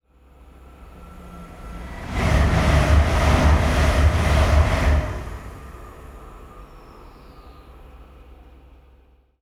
Soundscapes > Nature
A recording of a train passing by under a bridge.
train, bridge, recording, Field, ambience, residential